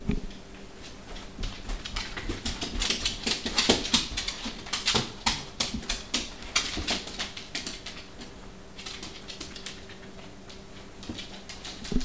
Sound effects > Animals
Dog claws on vinyl flooring
Medium Cockapoo dog walking on vinyl flooring. Sound of claws hitting floor.